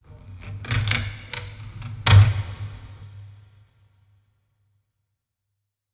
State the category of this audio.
Sound effects > Other